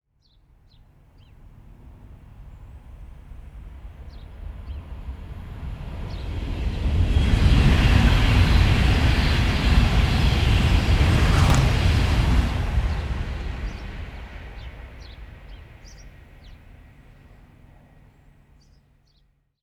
Soundscapes > Nature
A recording of a train passing by with birds in the background.
car, Field, ambience, recording, train, residential